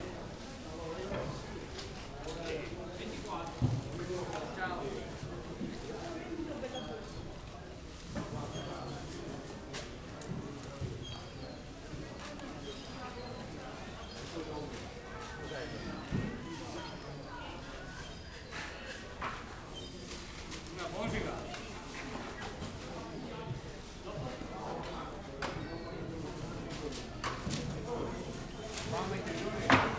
Soundscapes > Urban
The fish market of Venice, il Mercato Di Rialto, in the morning; the walla of people sell / buy fish, general market ambiance, seagulls, plastic bags... Recorded in the morning, winter 2025. AB omni stereo, recorded with 2 x EM272 Micbooster microphones & Tascam FR-AV2